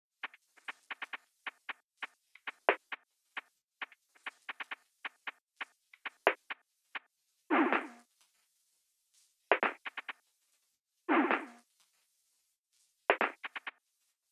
Instrument samples > Percussion
bg perc loop with 8-bit sounds and static atmos (134bpm)
hihats, drum, 8-bit, drums, sample, experimental, loop